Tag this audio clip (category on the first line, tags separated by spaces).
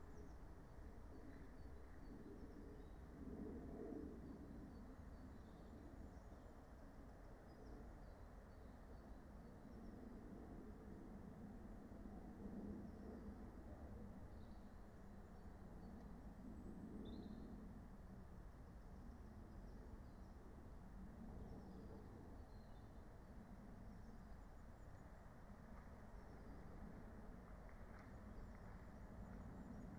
Soundscapes > Nature
phenological-recording field-recording alice-holt-forest raspberry-pi natural-soundscape soundscape nature meadow